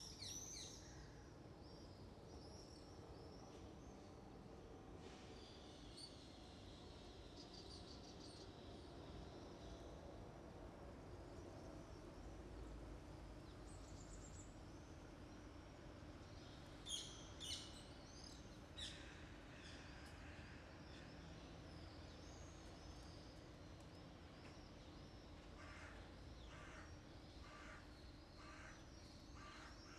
Soundscapes > Urban
Roma Rooftop EarlyMorning june2025 1
Early morning (5.30AM) on the rooftop of the Swiss Institute, Roma, june 2025. Many birds : seagulls and crows, swifts and parrots. General rumble of the city in the background. Sur le toit de l'Istituto Svizzero de Rome au petit matin (5h30), juin 2025. Les premiers oiseaux : mouettes, corneilles, martinets, perruches. Bruit de fond de la ville, encore léger.